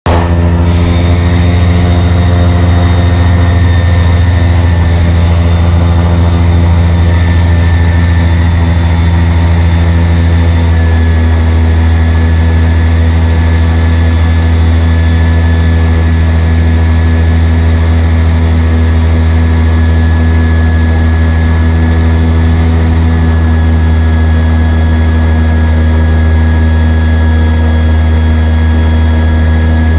Sound effects > Other mechanisms, engines, machines
Live airboat recording taken from the deck of an airpoat hurtling through the swamps. Live recorded on an iPhone. No dialog, just airboat engine noise.
nature, field-recording, outdoors, Airboat, swamp, boat